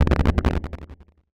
Sound effects > Electronic / Design
SHARP SERVER MOVING LEAK
CHIPPY, ELECTRONIC, INNOVATIVE, OBSCURE, UNIQUE, SHARP, DING, COMPUTER, CIRCUIT, BOOP, EXPERIMENTAL, HARSH, HIT, BEEP, SYNTHETIC